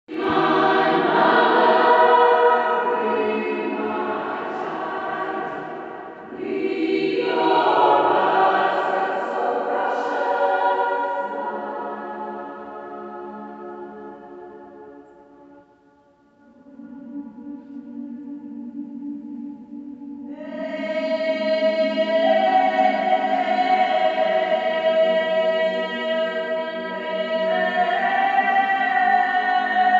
Music > Other
Irish Choir
Sounds of an Irish church choir recorded on my phone.
choir, choral, church, heavenly, religion, religious